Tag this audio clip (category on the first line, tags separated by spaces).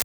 Instrument samples > Synths / Electronic
percussion databending glitch hihat